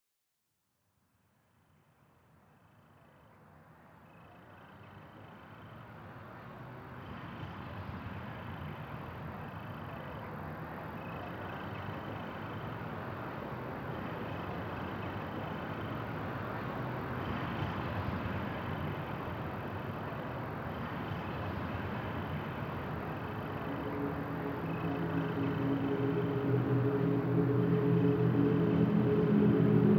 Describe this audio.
Music > Multiple instruments
I Love Thee, O.Y.O
Created in Ableton Live 12. Eventide Blackhole, sounds of ableton operator slowed down and proessed with secret fx Surge Sound Synthesizer, Zebra 2, Bullfrog Ballpit Softworks Sampled sounds of chain being yanked, windchimes, Native Instruments Kontakt 8 pads Various frog and rain sounds, various pond sounds. the frogs in my pond just would not keep their mouths best used in cinematic situations.
loop, dramatic